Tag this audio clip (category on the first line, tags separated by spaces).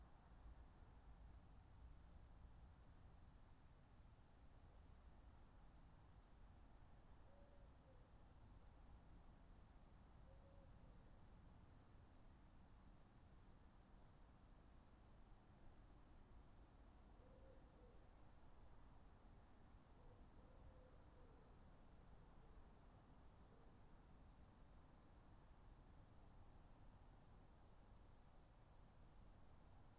Soundscapes > Nature
Dendrophone natural-soundscape soundscape alice-holt-forest phenological-recording sound-installation artistic-intervention weather-data field-recording data-to-sound nature raspberry-pi modified-soundscape